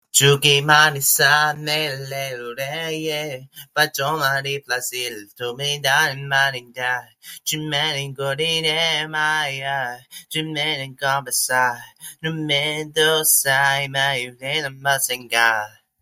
Music > Solo instrument
Brazilian Phonk Vocals Jmilton Type
Some free Brazilian Phonk vocals to use for your free Phonk songs. Recorded with my webcam microphone and this is my own recording.
brazil, male, sample, vocals, voice